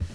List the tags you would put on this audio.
Sound effects > Objects / House appliances
bucket
carry
clang
clatter
cleaning
container
debris
drop
fill
foley
lid
metal
object
pail
plastic
pour
scoop
shake
slam
tip
tool

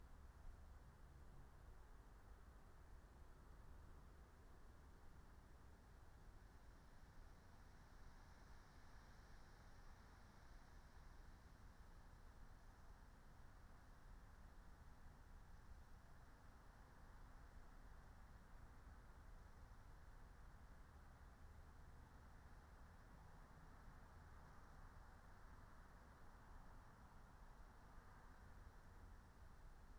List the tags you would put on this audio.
Soundscapes > Nature
meadow
raspberry-pi
soundscape
field-recording
nature
natural-soundscape
phenological-recording
alice-holt-forest

